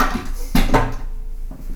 Sound effects > Other mechanisms, engines, machines
shop foley-004
bang, oneshot, tink, shop, bop, perc, wood, foley, thud, strike, percussion, fx, little, sfx, boom, knock, rustle, tools, bam, sound, pop, crackle, metal